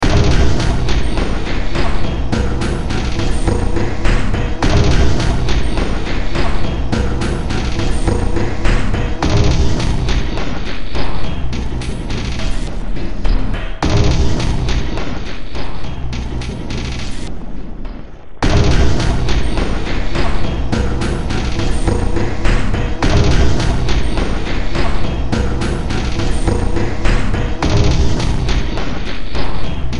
Music > Multiple instruments
Demo Track #3750 (Industraumatic)
Ambient, Cyberpunk, Games, Horror, Industrial, Noise, Sci-fi, Soundtrack, Underground